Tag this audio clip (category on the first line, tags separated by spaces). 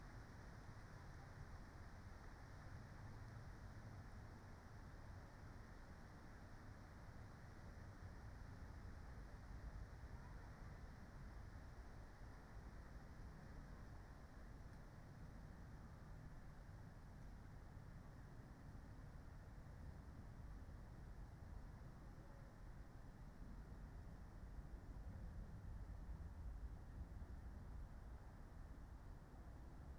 Soundscapes > Nature
weather-data sound-installation natural-soundscape raspberry-pi phenological-recording artistic-intervention Dendrophone soundscape field-recording nature alice-holt-forest data-to-sound modified-soundscape